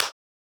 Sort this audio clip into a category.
Instrument samples > Percussion